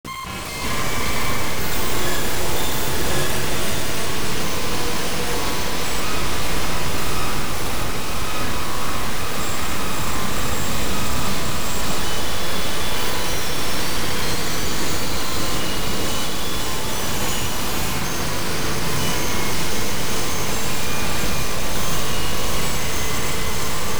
Sound effects > Electronic / Design
Ghost Grain Scratch 2
ambient, abstract, commons, noise, creative, noise-ambient